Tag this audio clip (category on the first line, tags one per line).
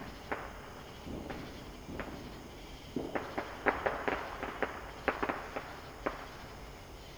Sound effects > Natural elements and explosions
america
patriotic
deep-south
experimental
southeastern-united-states
independence
sfx
cicadas
electronic
sample-packs
free-samples